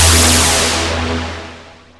Sound effects > Electronic / Design
Could be used as a blast or thruster sound FX. This sound was not created using A.I. Created using a Reason 12 synthesizer.
gaming, game, arcade